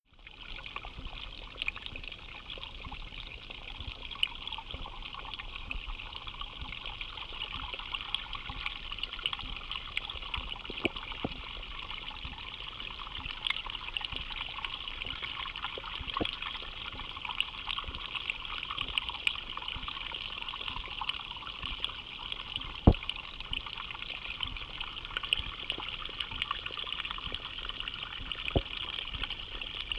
Soundscapes > Nature
020 BOTANICO FOUNTAIN HIDROPHONE 2 BIG
fountain, hydrophone, water